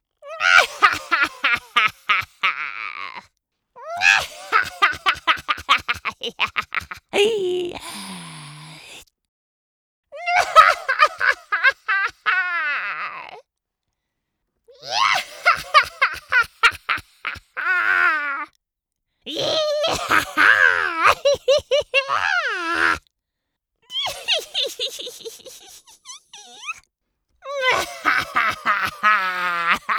Speech > Other
Witch Cackle
Dry Vocal, no processing. I reckon a bit of reverb should do the trick. Real human voice recorded on Sennheiser MKH 418 Scarlett 2I2. Have fun!
voice, female, laugh, spooky, scary, comedy, Cackle, Witch, fun, halloween